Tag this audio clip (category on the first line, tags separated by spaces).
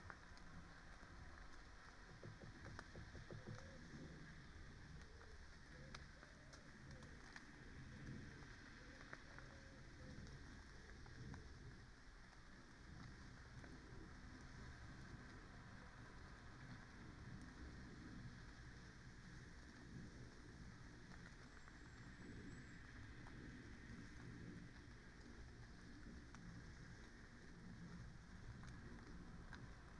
Soundscapes > Nature
phenological-recording soundscape data-to-sound raspberry-pi alice-holt-forest sound-installation